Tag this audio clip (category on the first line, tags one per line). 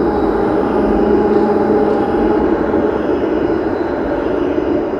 Sound effects > Vehicles
tramway
transportation
vehicle